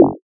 Instrument samples > Synths / Electronic
BWOW 4 Gb
bass, additive-synthesis, fm-synthesis